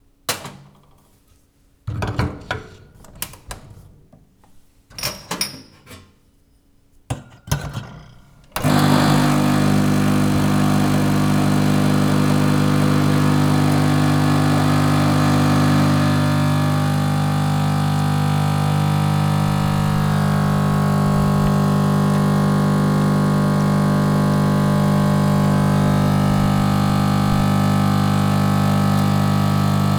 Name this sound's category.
Sound effects > Objects / House appliances